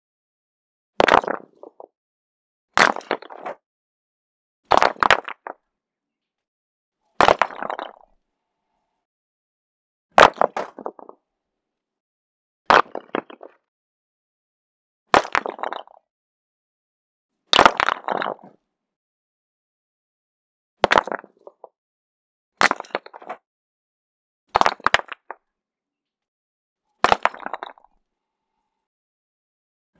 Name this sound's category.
Sound effects > Natural elements and explosions